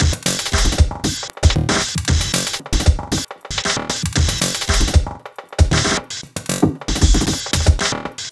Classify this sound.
Instrument samples > Percussion